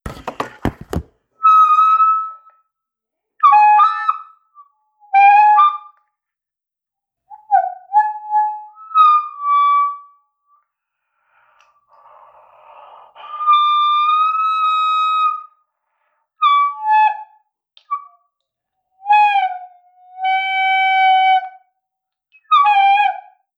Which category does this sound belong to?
Sound effects > Objects / House appliances